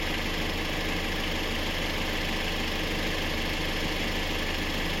Sound effects > Other mechanisms, engines, machines
clip auto (12)
Toyota, Auto, Avensis